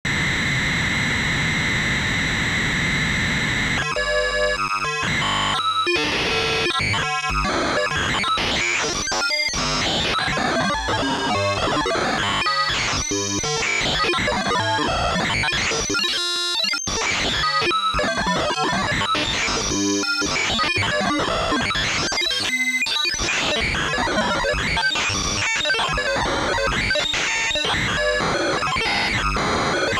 Sound effects > Electronic / Design
Modulator Demodulator
Modem, Noise, Synth, Chaotic